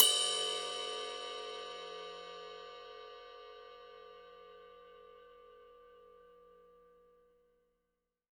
Music > Solo instrument
Cymbal hit with knife-003
Crash, Custom, Cymbal, Cymbals, Drum, Drums, FX, GONG, Hat, Kit, Metal, Oneshot, Paiste, Perc, Percussion, Ride, Sabian